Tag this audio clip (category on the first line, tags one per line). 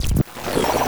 Sound effects > Electronic / Design
one-shot
digital
stutter
glitch
pitched
hard